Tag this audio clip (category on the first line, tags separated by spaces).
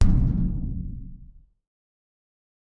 Sound effects > Other mechanisms, engines, machines
big boom drum dumbster hit hollow industrial metal metallic percussion